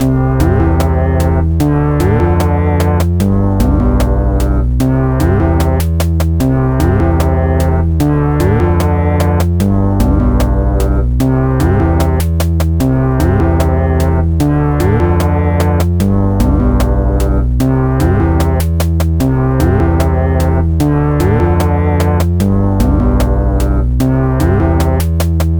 Music > Multiple instruments
25 seconds track, 6.5 seconds loop This track is Frenquency Modulated.
Intermission, Bass, Drum-kit, FM